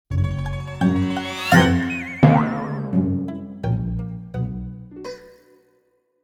Music > Multiple instruments
Orchestral Comedic Phrase (Rolling Ones)
comedic-leitmotif, comedic-moment, comedic-motif, comedic-music, comedic-musical-phrase, comedic-outro, comedic-phrase, comedic-stab, comedic-sting, comedic-theme, comedy-music, comedy-outro, comical-music, comic-phrase, funny-musical-hit, funny-musical-outro, funny-musical-phrase, musical-comedy, musical-humour, musical-oops, musical-whoops, music-comedy, oops, silly-musical-outro, silly-music-outro, silly-outro, uh-oh, whoops